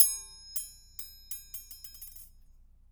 Sound effects > Objects / House appliances
knife and metal beam vibrations clicks dings and sfx-091
Clang, Foley, Metal, metallic, ting, Wobble